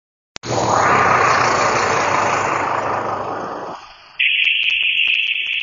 Soundscapes > Urban
Bus leaving 15
Where: Hervanta keskus What: Sound of a bus leaving bus stop Where: At a bus stop in the evening in a calm weather Method: Iphone 15 pro max voice recorder Purpose: Binary classification of sounds in an audio clip
traffic, bus, bus-stop